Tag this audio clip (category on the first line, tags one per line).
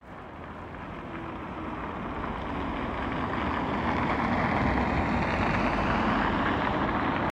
Soundscapes > Urban
car
traffic
vehicle